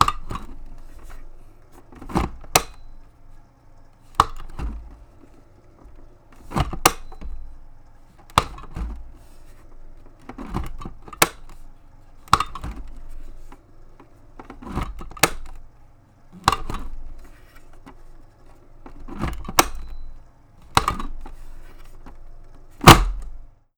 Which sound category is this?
Sound effects > Objects / House appliances